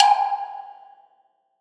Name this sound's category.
Instrument samples > Percussion